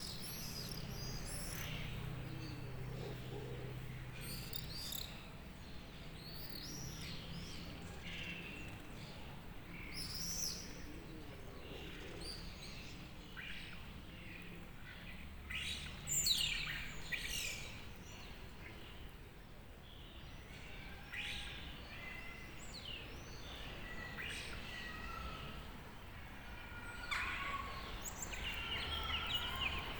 Soundscapes > Urban
20250521 Castelbuono morning pt3
recorded with an Oppo A9 mobile phone between 7:20 and 7:30 a.m. in Piazza Margherita in Castelbuono (PA) on 22 March 2025. The chirping of swallows can be heard. The cries of blackbirds, pigeons and other birds. A few cars pass through the square. One hears the voices of passers-by in the distance. Meowing of stray cats. Employees of the bakery overlooking the square move chairs and tables. The bell of the clock tower strikes the hours.
town, freesound20, birds